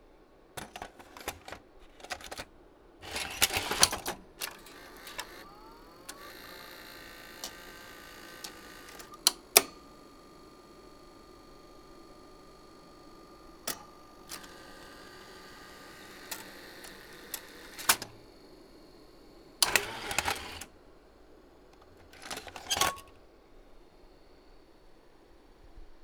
Sound effects > Other mechanisms, engines, machines
U-Matic recorder insert & eject
Sound of a Sony BVU-950P accepting, threading, unthreading and finally ejecting a U-Matic SP cassette. The sound features inserting the cassette in, the VTR then accepting the cassette and threading it. Shortly after the eject button is pressed, the VTR begins unthreading the tape and ejecting the cassette, then the cassette being taken out of the compartment. This is a second recording. Recorded with the Zoom H1n.